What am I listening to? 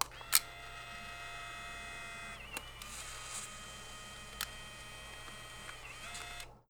Sound effects > Objects / House appliances
COMCam-Blue Snowball Microphone Fuji Instax Mini 9 Camera, Shutter, Take Picture, Dispense Nicholas Judy TDC
A Fuji Instax Mini 9 camera shuttering, taking and dispensing a picture.
Blue-Snowball, camera, shutter, Blue-brand, take, dispense, fuji-insatx-mini-9, picture